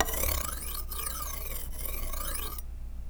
Sound effects > Objects / House appliances
knife and metal beam vibrations clicks dings and sfx-055
ding, SFX, metallic, Trippy, Perc, FX, Beam, Klang, Vibration, Metal, Clang, ting, Foley, Vibrate, Wobble